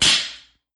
Sound effects > Objects / House appliances
METLImpt-Samsung Galaxy Smartphone, CU Circular Tray, Drop Nicholas Judy TDC
A circular tray dropping.